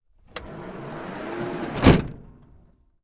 Sound effects > Vehicles
Ford 115 T350 - Side door closing
115, 2003, 2003-model, 2025, A2WS, August, Ford, Ford-Transit, France, FR-AV2, Mono, Old, Single-mic-mono, SM57, T350, Tascam, Van, Vehicle